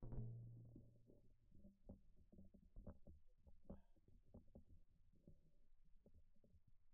Music > Solo percussion
Med-low Tom - Oneshot 31 12 inch Sonor Force 3007 Maple Rack
acoustic, oneshot, loop, real, kit, maple, drum, realdrum, quality, flam